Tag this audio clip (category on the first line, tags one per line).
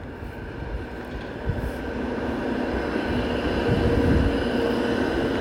Soundscapes > Urban
tampere
tram
vehicle